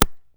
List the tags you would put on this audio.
Sound effects > Other
click hit impact

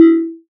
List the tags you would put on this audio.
Instrument samples > Synths / Electronic
additive-synthesis bass

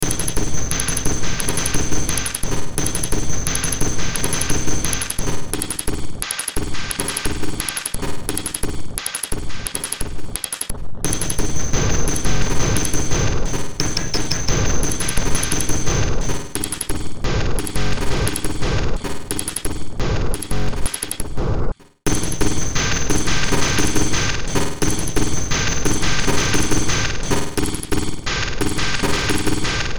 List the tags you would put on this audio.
Music > Multiple instruments
Games Noise Cyberpunk Underground Industrial Ambient Soundtrack Sci-fi Horror

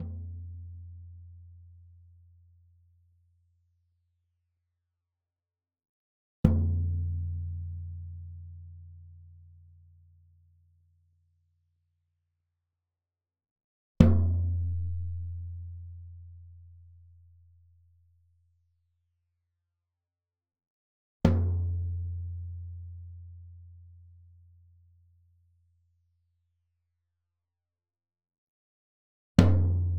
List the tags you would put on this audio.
Music > Solo percussion
acoustic beat beatloop beats drum drumkit drums fill flam floortom instrument kit oneshot perc percs percussion rim rimshot roll studio tom tomdrum toms velocity

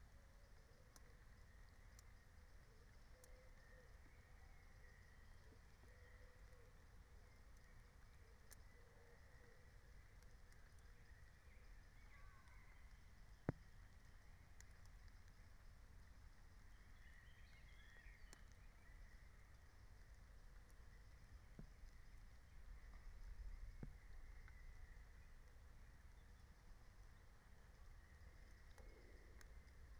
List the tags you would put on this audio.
Soundscapes > Nature
soundscape raspberry-pi phenological-recording nature alice-holt-forest field-recording natural-soundscape

